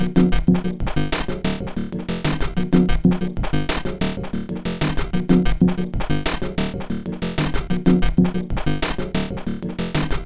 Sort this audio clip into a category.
Instrument samples > Percussion